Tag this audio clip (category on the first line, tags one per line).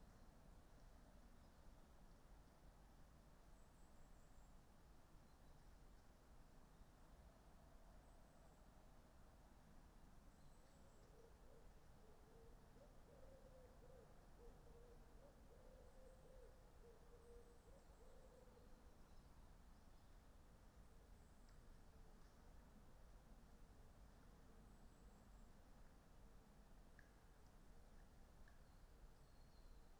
Soundscapes > Nature
data-to-sound
Dendrophone
field-recording
nature
sound-installation
natural-soundscape
modified-soundscape
artistic-intervention
soundscape
raspberry-pi
weather-data
alice-holt-forest
phenological-recording